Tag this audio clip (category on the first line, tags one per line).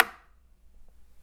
Sound effects > Objects / House appliances
bonk
clunk
drill
fieldrecording
foley
foundobject
fx
glass
hit
industrial
mechanical
metal
natural
object
oneshot
perc
percussion
sfx
stab